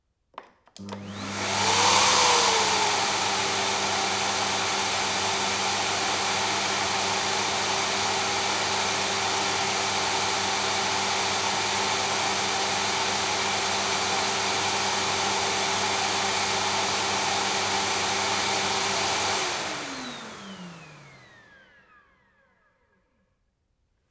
Sound effects > Objects / House appliances
250726 - Vacuum cleaner - Philips PowerPro 7000 series - Vacuum cleaner 1m away lowest setting (focus on all elements)
MKE600, cleaner, FR-AV2, Powerpro, Tascam, Shotgun-mic, Shotgun-microphone, aspirateur, vacuum, 7000, Hypercardioid, vacuum-cleaner, Vacum, Powerpro-7000-series, MKE-600, Single-mic-mono, Sennheiser